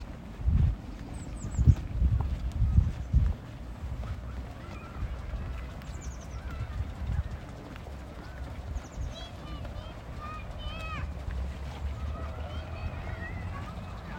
Soundscapes > Nature
Soundwalk Park
Walking past a park with children playing, birds chirping and sprinkler watering the grass. Recorded with Iphone 13 mini using Apples "Voice Memos".
nature, summer